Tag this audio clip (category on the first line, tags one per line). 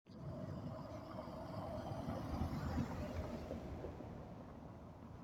Sound effects > Vehicles
automobile
car
drive
driving
vehicle